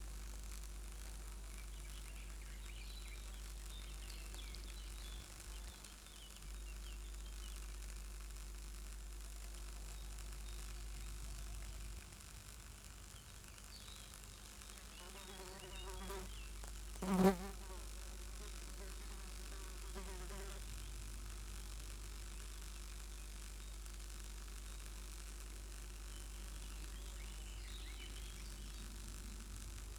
Soundscapes > Urban
Electric noise & hum of an overhead power line in the countryside; birds in the background. Le bourdonnement et le grésillement d'une ligne à haute tension, dans la campagne bourguignonne. Des oiseaux chantent dans le fond.